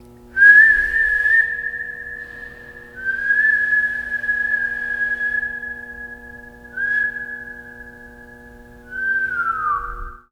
Music > Solo instrument
Silbando en sotano Lima Peru
Melodic sound of a person whistling in a basement.
melodic, reverb, echo, lima, basement, whistle, peru